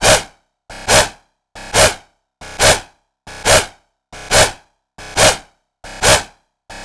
Music > Other
Dubstep Transient Booster140BPM
It was a failed growl bass I made,but I find that it can Boost dynamic for bass after flanger FX added